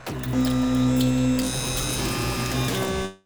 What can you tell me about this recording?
Sound effects > Electronic / Design
One-shot Glitch SFX with a mechanical Feel.
digital, one-shot